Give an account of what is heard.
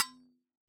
Sound effects > Objects / House appliances
Solid coffee thermos-020
percusive, sampling, recording